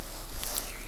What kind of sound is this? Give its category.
Sound effects > Objects / House appliances